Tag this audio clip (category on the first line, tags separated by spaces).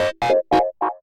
Sound effects > Experimental
alien analog analogue bass basses complex dark effect electro fx machine retro robot robotic sci-fi scifi snythesizer synth